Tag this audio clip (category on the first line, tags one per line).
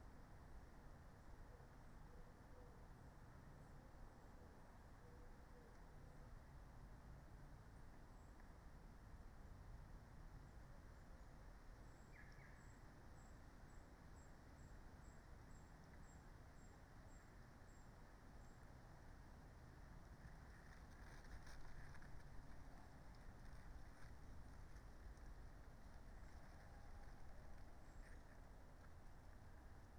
Soundscapes > Nature
phenological-recording
natural-soundscape
sound-installation
raspberry-pi
artistic-intervention
alice-holt-forest
modified-soundscape
data-to-sound
weather-data
nature
soundscape
Dendrophone
field-recording